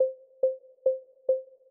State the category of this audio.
Sound effects > Electronic / Design